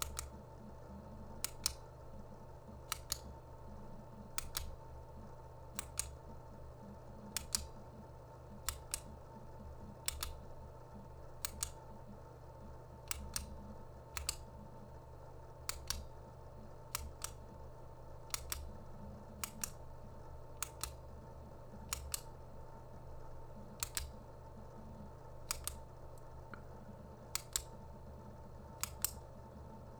Sound effects > Objects / House appliances
OBJWrite-Blue Snowball Microphone Retractable Pen, Top, Clicking Nicholas Judy TDC
A retractable pen top clicking.
retractable-pen, Blue-Snowball, Blue-brand, click, top